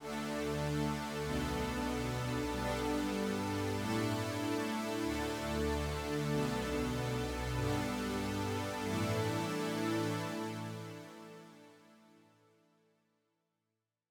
Instrument samples > Piano / Keyboard instruments
pad-chords
80s synth pad